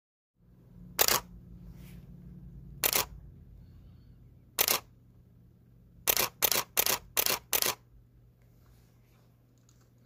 Sound effects > Objects / House appliances
ShutterClick-My6D
I Made this by recording the shutter click combined with the mirror action of a Canon 6D DSLR camera with my Samsung Galaxy phone.
Shutter, Camera, Click